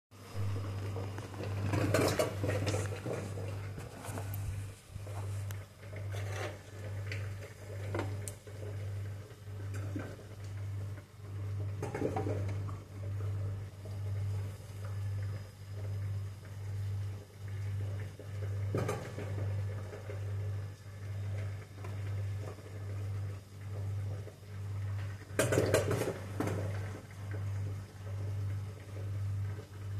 Sound effects > Other
WHITCH CAULDRON
A whitch soup boiling in a noisy cauldron. 00:00 to 01:00 Medium distance 01:00 to 02:00 Close distance 02:00 to 03:00 Far distance